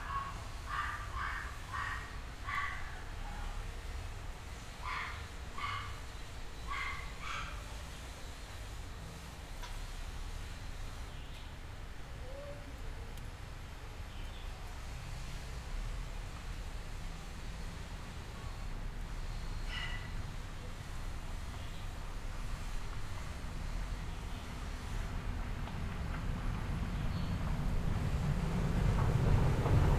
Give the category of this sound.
Soundscapes > Urban